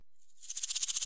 Instrument samples > Percussion
Dual shaker-011
Two shakers assembled by a wood handle were played to achieve some different dual-shaker transitions.
percusive, recording, sampling